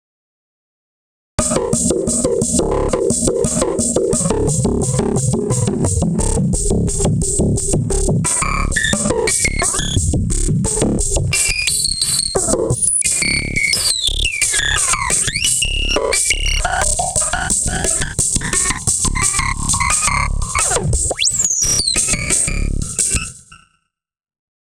Music > Solo percussion
Simple Bass Drum and Snare Pattern with Weirdness Added 056
Interesting-Results, Bass-Drum, Experimental-Production, FX-Drums, FX-Drum-Pattern, FX-Laden-Simple-Drum-Pattern, Bass-and-Snare, Experimental, Snare-Drum, Silly, Glitchy, Experiments-on-Drum-Beats, FX-Laden, Simple-Drum-Pattern, Four-Over-Four-Pattern, Fun, FX-Drum, Noisy, Experiments-on-Drum-Patterns